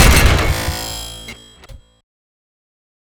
Sound effects > Other
Sound Design Elements Impact SFX PS 104.
rumble, cinematic, sfx, shockwave, hit, game, transient, heavy, crash, strike, power, sound, smash, audio, design, sharp, force